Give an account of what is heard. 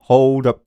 Speech > Solo speech
Hoold up 2
Vocal, Single-take, U67, hold, Tascam, Man, Neumann, FR-AV2, oneshot, un-edited, Male, hype, singletake, voice, chant